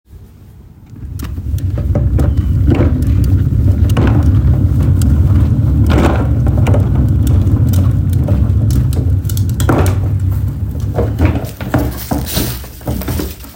Sound effects > Other mechanisms, engines, machines
Trash Can Wheels Rolling
Trash can on wheels being rolled across pavement. Great by itself, or could be processed and pitched down to create spaceship rumble, or giant rolling stone or boulder (Indiana Jones-style).
boulder, field-recording, foley, garbage, Indiana-Jones, pavement, rock, roll, rolling, wheel, wheels